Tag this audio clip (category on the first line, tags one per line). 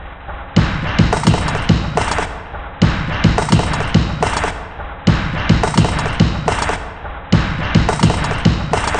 Instrument samples > Percussion
Loopable
Weird
Ambient
Alien
Soundtrack
Industrial
Drum
Underground
Dark
Loop
Samples
Packs